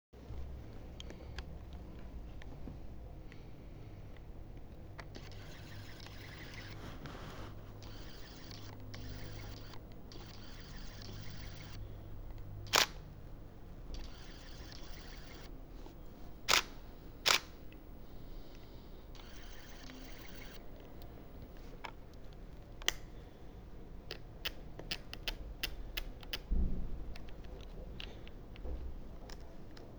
Sound effects > Other mechanisms, engines, machines
20250515 1547 camera phone microphone
recording, atmophere